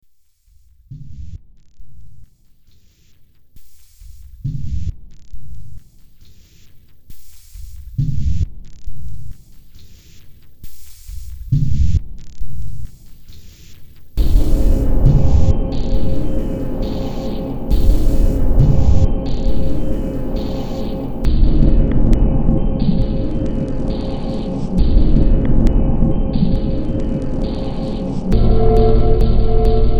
Music > Multiple instruments
Demo Track #3232 (Industraumatic)
Ambient, Cyberpunk, Games, Horror, Industrial, Noise, Sci-fi, Soundtrack, Underground